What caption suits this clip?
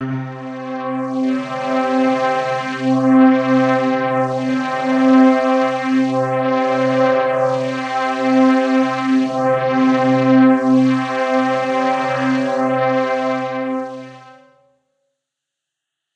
Instrument samples > Synths / Electronic
Synth ambient pad with a slow atmospheric pad sound. Note is C4